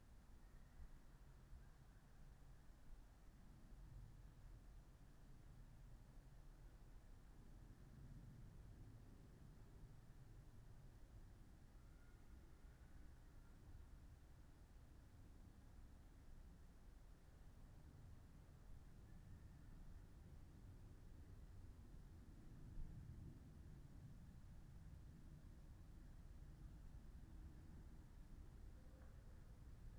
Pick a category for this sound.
Soundscapes > Nature